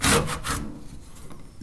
Sound effects > Other mechanisms, engines, machines
Handsaw Tooth Teeth Metal Foley 7
tool, shop, household, sfx, foley, vibration, twang, vibe, handsaw, hit, fx, perc, metal, twangy, plank, metallic, percussion, smack, saw